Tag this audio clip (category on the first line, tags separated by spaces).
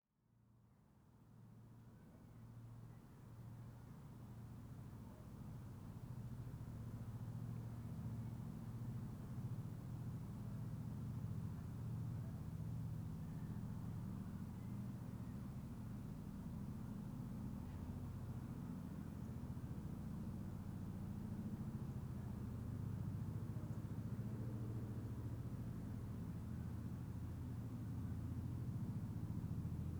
Sound effects > Vehicles
chopper copter flying helicopter ZoomF3